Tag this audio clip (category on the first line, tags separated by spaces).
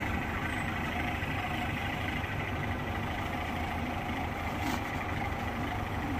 Sound effects > Vehicles
finland hervanta